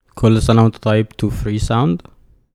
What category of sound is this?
Speech > Solo speech